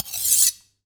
Sound effects > Objects / House appliances
Chef Knife sharpening 03 short
Subject : Sharpening a knife with a old laguiole sharpening stone (somewhat "knife blade" shaped itself.) Date YMD : 2025 July 20 Location : In a kitchen. Sennheiser MKE600 P48, no filter. Weather : Processing : Trimmed in Audacity. Notes : Recorded for Dare2025-09 Metal Friction series of dares.
close-up, Dare, Dare2025-09, Dare2025-Friction, FR-AV2, Friction, Hypercardioid, Indoor, Knife, Metal, MKE-600, MKE600, rubbing, scrape, Sennheiser, sharpen, Sharpening, sharpening-stone, Shotgun-mic, Shotgun-microphone, Single-mic-mono, Stone, Tascam